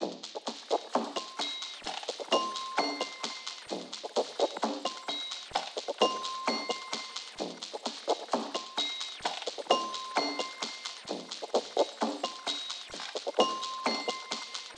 Music > Solo percussion
130bpm, the bell is playing C, all sounds are played by me: weird child toy percussion and an African drum idk why i own. With FX ofc. free 4 profit.
130-bpm, acoustic, drum-loop, drums, freeforprodit, groovy, loop, perc, percloop, percs, percussion-loop, real